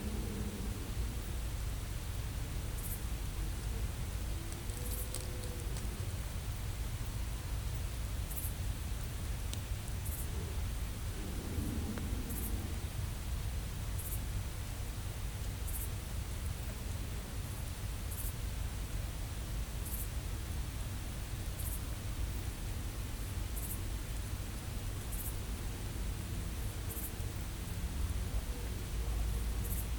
Soundscapes > Nature
20250906 00h00 Gergueil Garden ambience DJI single
Subject : Ambience recording from a garden in Gergueil. Recorded from under a wheel barrow. Date YMD : 2025 September 06 at 00h00 Location : Gergueil 21410 Bourgogne-Franche-Comte Côte-d'Or France Hardware : Dji Mic 3 internal recording. Weather : Processing : Trimmed and normalised in Audacity.